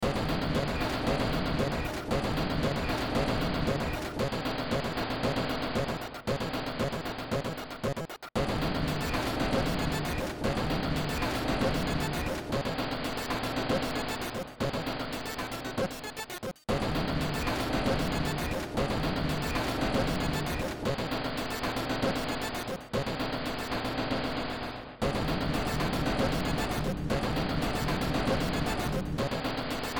Music > Multiple instruments
Short Track #3837 (Industraumatic)
Cyberpunk, Games, Industrial, Ambient, Sci-fi, Underground, Horror, Soundtrack, Noise